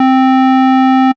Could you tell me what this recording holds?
Instrument samples > Synths / Electronic
04. FM-X ODD1 SKIRT4 C3root
FM-X, MODX, Montage, Yamaha